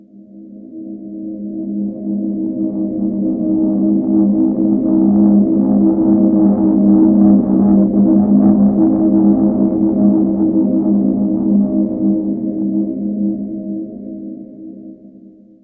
Sound effects > Electronic / Design
Tenebrous glass drone
"Creepy musical glass" from xkeril, pitch-shifted, reversed and looped using the EHX 22500 dual loop pedal, with additional pitch shifting from EHX Pitchfork and sampled using Chase Bliss Onward. Resulting into a rather tenebrous short drone pad.
experimental, glass, artificial, drone, ambient, soundscape